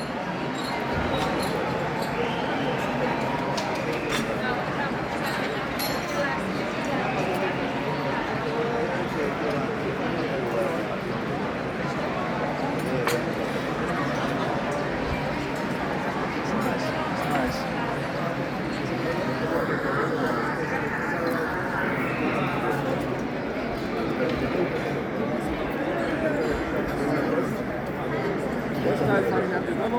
Soundscapes > Urban
Salamanca Plaza Mayor EXT ambience 26 May 2024
Plaza Mayor, Salamanca, Spain. Diners in outdoor cafes talking. Steady din of voices, plates and utensils clinking. Recorded with Samsung Galaxy smartphone.